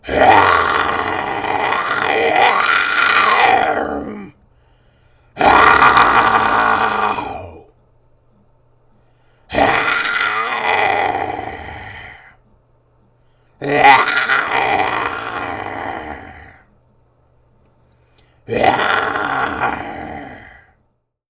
Sound effects > Animals
A wild animal roaring. Human imitation. Cartoon.